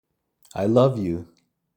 Speech > Solo speech
just an 'I love you' from a project I was working on.